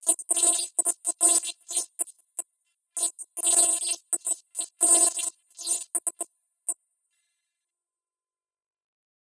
Sound effects > Electronic / Design
Retro NPC Voice
An experiment of modulating a sample of mine to sound like classic retro mumbo jumbo from an NPC. Akin to something like K.K. Slider, but from the 8-bit era.
8-bit, 8bit, chip, glitch, npc, retro, video-game, videogame, voice